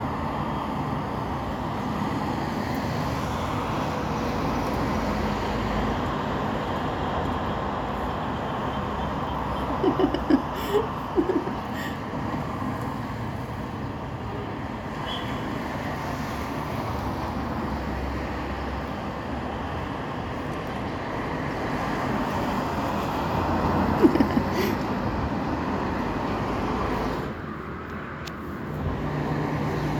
Soundscapes > Other
A woman's soft laughter during street noise
cars, voice